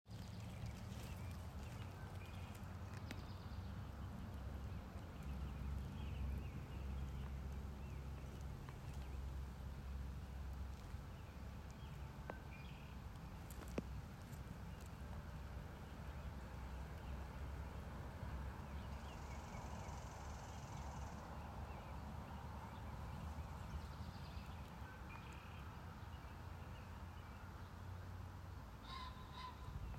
Soundscapes > Nature
just a little nature
Just took a moment to sit down and record some nature sounds. recorded with my iphone
ambiance, ambience, ambient, bird, birds, birdsong, field-recording, forest, grass, nature, spring, wind